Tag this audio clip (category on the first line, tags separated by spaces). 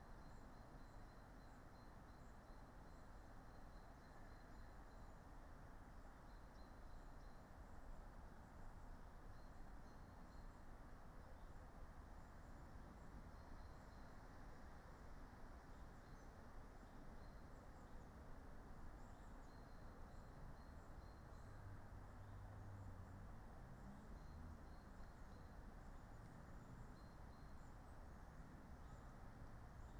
Soundscapes > Nature
weather-data alice-holt-forest nature modified-soundscape field-recording raspberry-pi Dendrophone sound-installation soundscape phenological-recording natural-soundscape artistic-intervention data-to-sound